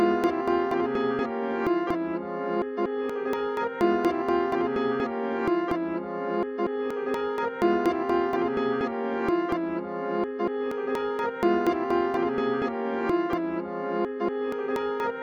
Instrument samples > Percussion
This 126bpm Drum Loop is good for composing Industrial/Electronic/Ambient songs or using as soundtrack to a sci-fi/suspense/horror indie game or short film.
Alien, Ambient, Dark, Industrial, Loop, Loopable, Samples, Soundtrack, Weird